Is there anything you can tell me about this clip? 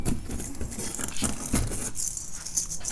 Sound effects > Other mechanisms, engines, machines
grinder wire brush foley-001
Shop, Bristle, Scrape, Household, Mechanical, Workshop, Tools, Brushing, Foley, fx, sfx, Tool, Metallic, Brush, Woodshop